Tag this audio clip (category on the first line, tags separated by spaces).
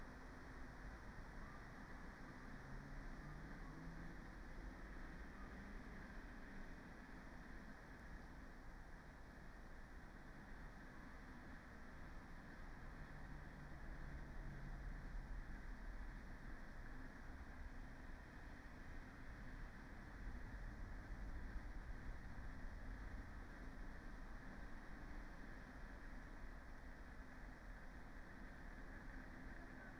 Soundscapes > Nature
artistic-intervention nature Dendrophone field-recording raspberry-pi soundscape weather-data data-to-sound modified-soundscape alice-holt-forest phenological-recording natural-soundscape sound-installation